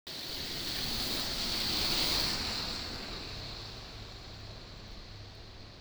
Sound effects > Vehicles
tampere bus11
bus passing by near Tampere city center